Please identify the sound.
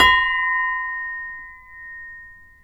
Sound effects > Other mechanisms, engines, machines
metal shop foley -047
bang, oneshot, metal, shop, bam, tools, thud, sound, boom, foley, rustle, tink, fx